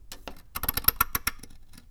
Sound effects > Other mechanisms, engines, machines
metal shop foley -115
bam, bang, boom, bop, crackle, foley, fx, knock, little, metal, oneshot, perc, percussion, pop, rustle, sfx, shop, sound, strike, thud, tink, tools, wood